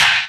Instrument samples > Percussion
Zildjian fake 1
based on the China crash: Zildjian 19 inches Z3 I applied low cut from 200 ㎐ and envelope redrawing on WaveLab. It sounds like a bass closed hi-hat.
19-inches-Zildjian-Z3, bang, boom, China, Chinese, clang, clash, crack, crash, crunch, cymbal, flangcrash, hi-hat, Istanbul, Meinl, metal, metallic, Paiste, ride, Sabian, shimmer, sinocrash, Sinocymbal, sizzle, smash, Soultone, Stagg, UFIP, Zildjian